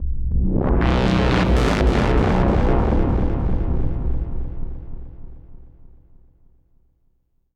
Sound effects > Experimental
Analog Bass, Sweeps, and FX-077
alien, analog, analogue, bass, basses, bassy, complex, dark, effect, electro, electronic, fx, korg, machine, mechanical, oneshot, pad, retro, robot, robotic, sample, sci-fi, scifi, sfx, snythesizer, sweep, synth, trippy, vintage, weird